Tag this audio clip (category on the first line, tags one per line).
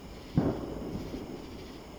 Sound effects > Other
america
day
electronic
experimental
explosions
fireworks
fireworks-samples
free-samples
independence
patriotic
sample-packs
samples
sfx
United-States